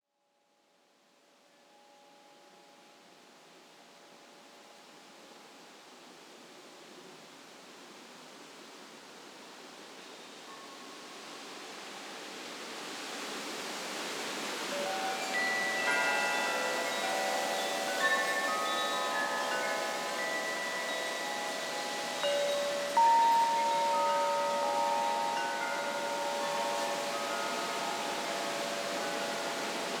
Soundscapes > Other
Wind and Winchimes 103125
Condo deck recording of my windchimes being blown around from gusty winds. Sometimes crickets can be heard in the quieter parts. Audio recorded with a Zoom H6 Essential. Audio edited (for volume) in AVS Audio editor Audio further edited (for crossfades) in Sony Vegas Pro 22.0. I had to edit out MANY loud commercial jets!
wind, gusts, wind-chimes